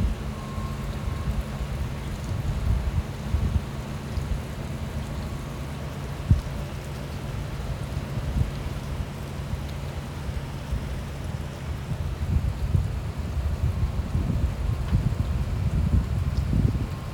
Soundscapes > Nature
Rain and Wind (medium strength)
Medium rain and wind Sound is my own, recorded on an iPhone 12
wind, rain, outside, ambience